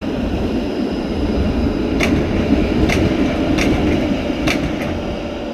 Sound effects > Vehicles
Sound recording of a tram passing by. While moving the tram travels over a unsmooth surface causing an audible thump multiple times. Recording done in Hervanta, Finland near the tram line. Sound recorded with OnePlus 13 phone. Sound was recorded to be used as data for a binary sound classifier (classifying between a tram and a car).
Finland, Public-transport, Tram